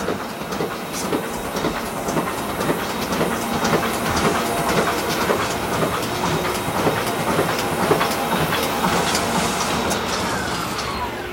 Sound effects > Other mechanisms, engines, machines
a recording I made of our offset press running and stopping.